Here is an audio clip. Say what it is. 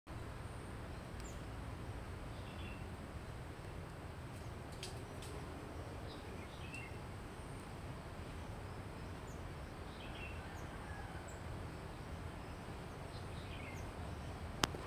Soundscapes > Urban
ambiance with distant traffic jogging track 02
A recording of the ambiance on the jogging track area of Diponegoro University. Recorded relatively deep into the park, sounds of nature, wind, running water, and distant vehicles can be heard.
jogging, vehicle, distant, park, soundscape, urban, track